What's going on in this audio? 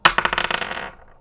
Sound effects > Objects / House appliances
A seashell clattering.